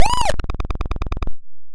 Sound effects > Electronic / Design
Optical Theremin 6 Osc dry-106
Theremins, DIY, Scifi, Optical, Infiltrator, Robot, Handmadeelectronic, Alien, Robotic, Sci-fi, Electronic, FX, Analog, Theremin, Electro, Synth, Bass, Otherworldly, noisey, Noise, Spacey, Glitch, Trippy, Instrument, Digital, Glitchy, Dub, SFX, Experimental, Sweep